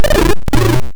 Sound effects > Electronic / Design
Optical Theremin 6 Osc dry-062
Sounds from an Optical Theremin i built using a 74C14 HEX Schmidtt inverter. There are 6 oscillators built from photoresistors, joysticks from old PS2 controllers, and various capacitors and pots. The light source used in these recordings was ambient and direct sunlight coming from the skylights in my music studio. Further processing with infiltrator, shaperbox, and various other vsts was also implemented on some of the sounds in this pack. Final batch processing was done in Reaper
Infiltrator, Instrument, DIY, Noise, Alien, Trippy, Spacey, noisey, Scifi, Robotic, Electro, Bass, Theremin, Dub, Glitch, Experimental, Theremins, SFX